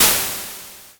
Sound effects > Electronic / Design
Matchstick fire being extinguished abruptly, with a clearly telegraphed sizzle. Variation 2 of 3.
extinguish, snuffed